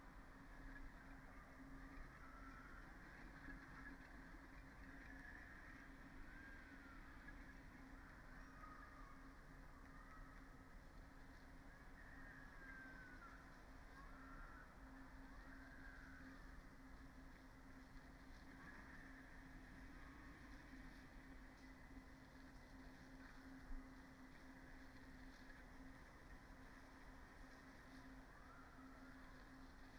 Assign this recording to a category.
Soundscapes > Nature